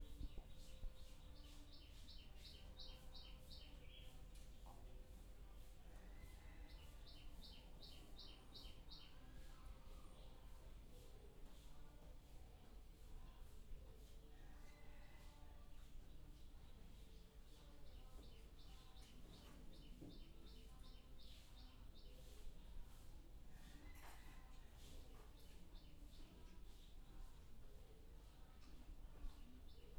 Soundscapes > Nature
Morning Ambience, Pai, Thailand (March 9, 2019)
Morning soundscape in Pai, Thailand, recorded on March 9, 2019. Features birdsong, gentle breeze and the peaceful early hours of the day.
Thailand, birdsong